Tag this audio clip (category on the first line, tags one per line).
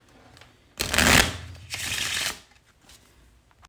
Sound effects > Human sounds and actions
playing-cards
cards
card-shuffle